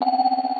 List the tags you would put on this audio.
Sound effects > Electronic / Design
interface message alert digital confirmation selection

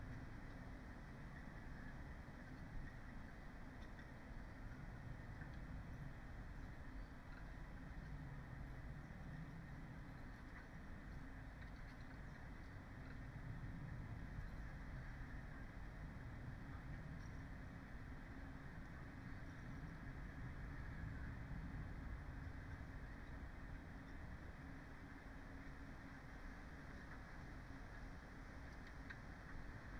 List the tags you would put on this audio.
Soundscapes > Nature
weather-data sound-installation data-to-sound field-recording artistic-intervention raspberry-pi alice-holt-forest natural-soundscape phenological-recording Dendrophone soundscape nature modified-soundscape

